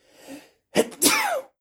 Sound effects > Human sounds and actions
HMNSneez-Samsung Galaxy Smartphone, CU Sneeze Nicholas Judy TDC
human, male, Phone-recording